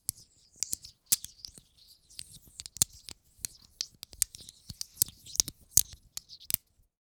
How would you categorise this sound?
Sound effects > Objects / House appliances